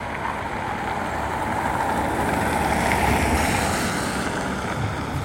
Sound effects > Vehicles
car sunny 01
car, engine, vehicle